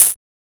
Instrument samples > Percussion
8 bit-Noise Tambournie
8-bit, FX, game, percussion